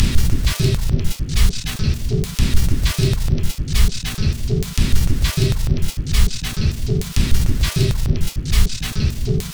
Instrument samples > Percussion
This 201bpm Drum Loop is good for composing Industrial/Electronic/Ambient songs or using as soundtrack to a sci-fi/suspense/horror indie game or short film.
Ambient, Industrial, Loopable, Samples, Soundtrack, Underground